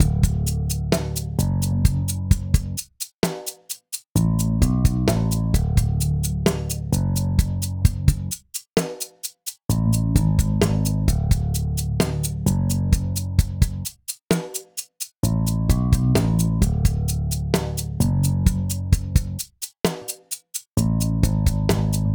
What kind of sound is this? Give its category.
Music > Multiple instruments